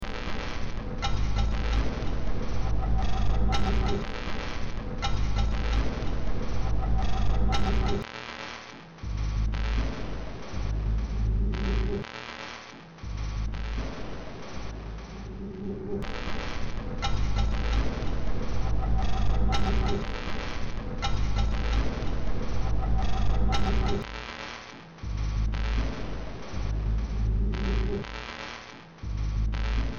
Music > Multiple instruments
Demo Track #3204 (Industraumatic)
Ambient, Cyberpunk, Games, Horror, Industrial, Noise, Sci-fi, Soundtrack, Underground